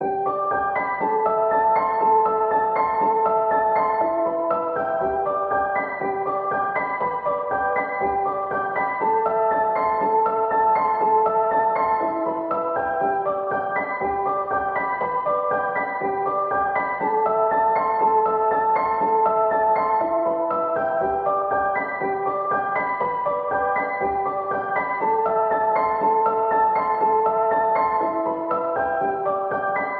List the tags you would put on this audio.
Music > Solo instrument
simplesamples
120
samples
piano
music
reverb
loop
pianomusic
simple
120bpm
free

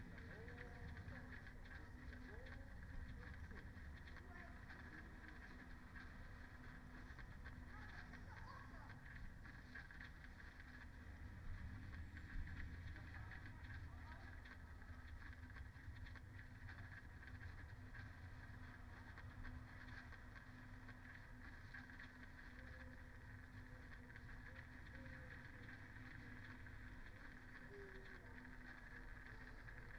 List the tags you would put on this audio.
Soundscapes > Nature
phenological-recording
alice-holt-forest
nature
data-to-sound
modified-soundscape
Dendrophone
artistic-intervention
weather-data
natural-soundscape
raspberry-pi
soundscape
sound-installation
field-recording

